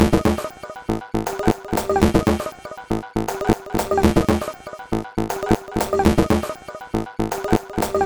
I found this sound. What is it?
Instrument samples > Percussion
This 238bpm Drum Loop is good for composing Industrial/Electronic/Ambient songs or using as soundtrack to a sci-fi/suspense/horror indie game or short film.
Soundtrack, Industrial, Loopable, Dark, Alien, Ambient, Weird, Samples, Packs, Drum, Underground, Loop